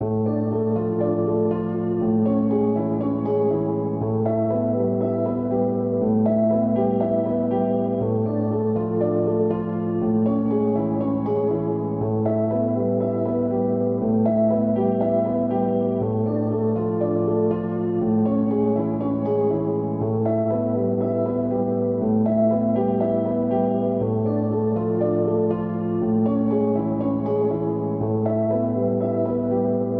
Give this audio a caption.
Music > Solo instrument
Piano loops 044 efect 4 octave long loop 120 bpm
reverb, 120bpm, music, samples, loop, free, piano, simple, 120, pianomusic, simplesamples